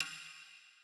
Music > Solo percussion
Snare Processed - Oneshot 103 - 14 by 6.5 inch Brass Ludwig
crack, fx, roll, reverb, drums, kit, hit, hits, snares, rimshots, sfx, perc, realdrum, rimshot, beat, snare, rim, ludwig, oneshot, drumkit, flam, brass, percussion, acoustic, snareroll, snaredrum, realdrums, processed, drum